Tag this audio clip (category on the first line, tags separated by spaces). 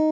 Instrument samples > String
guitar tone stratocaster design arpeggio cheap sound